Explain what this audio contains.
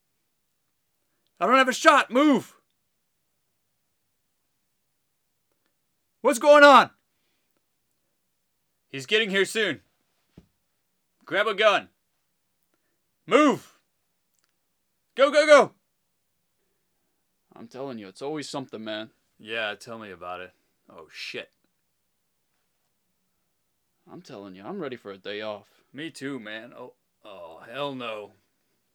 Sound effects > Human sounds and actions
Henchman #1 Voice Lines
Send us what you use it in! We'd love to see your work. Check it out here!